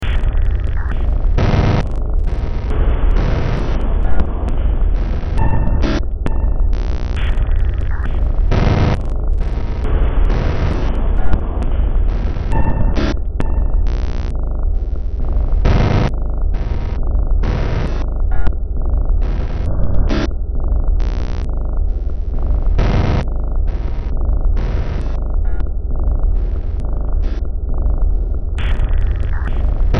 Music > Multiple instruments
Demo Track #3562 (Industraumatic)
Industrial, Cyberpunk, Sci-fi, Noise, Underground, Ambient, Soundtrack, Horror, Games